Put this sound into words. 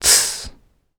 Speech > Solo speech
Annoyed - Tss
annoyed, dialogue, FR-AV2, grumpy, Human, Male, Man, Mid-20s, Neumann, NPC, oneshot, singletake, Single-take, talk, Tascam, U67, upset, Video-game, Vocal, voice, Voice-acting